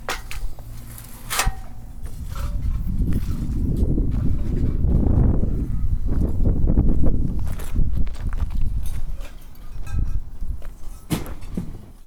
Sound effects > Objects / House appliances
Junkyard Foley and FX Percs (Metal, Clanks, Scrapes, Bangs, Scrap, and Machines) 178
Ambience, Atmosphere, Bang, Bash, Clang, Clank, Dump, dumping, dumpster, Environment, Foley, FX, garbage, Junk, Junkyard, Machine, Metal, Metallic, Perc, Percussion, rattle, Robot, Robotic, rubbish, scrape, SFX, Smash, trash, tube, waste